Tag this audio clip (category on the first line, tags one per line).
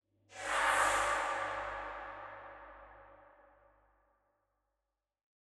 Sound effects > Electronic / Design
air
flyby
gaussian
jet
pass-by
Sound
swoosh
synth
transition
ui
whip
whoosh